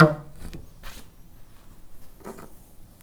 Sound effects > Other mechanisms, engines, machines
Handsaw Oneshot Metal Foley 18
foley, fx, handsaw, hit, household, metal, metallic, perc, percussion, plank, saw, sfx, shop, smack, tool, twang, twangy, vibe, vibration